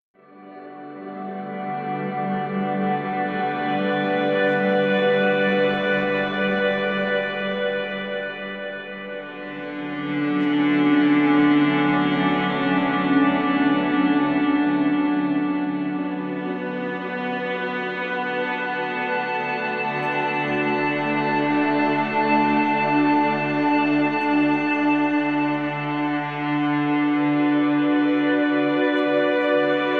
Soundscapes > Synthetic / Artificial
Ambient Subtly-changing New Age Soundscape by Moodscaper #003
Ambient relaxing soundscape improvised with Moodscaper on iPad. It’s relaxing, meditative and changing - but not too much. Recorded with AUM
Newage, relaxing, soundscape, relax, meditative, Dreamscape, moodscaper, ipad, relaxation, meditation, Ambient